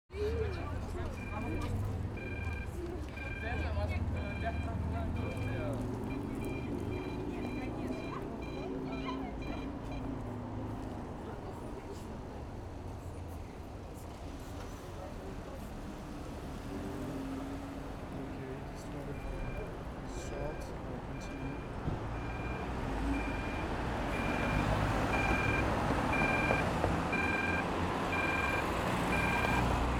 Soundscapes > Urban
crossroad bips in Sophia
Croassroad in Sophia, Bulgaria. Crossroad in Sophia focus on the bip sound for pedestrians. Cars are passing bye next to us, traffic.
voices, people, field-recording, ambience, cars, traffic, city, crossroad, pedestrians, Sophia, ambiance, Bulgaria, bip, street